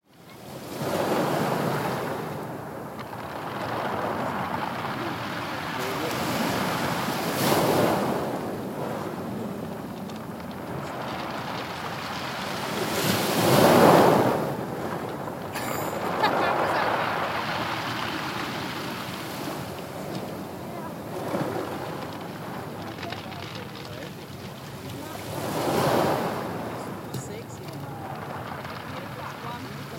Soundscapes > Nature
Nice - Seaside, Waves Crash, Laughter
Recorded on an iPhone SE.